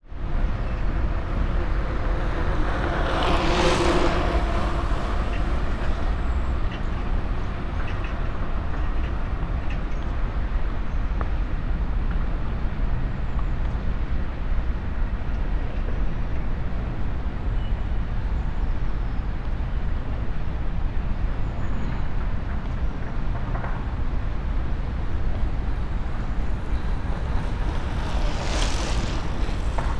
Soundscapes > Urban
City of Ghent 2
Soundscape of the city of Ghent. December 2025. Recorded with Stogie microphones in a Zoom F3.
ambiance, belgium, city, gent